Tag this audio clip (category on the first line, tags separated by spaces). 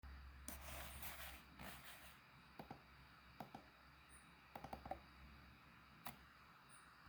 Sound effects > Objects / House appliances
desktop electronics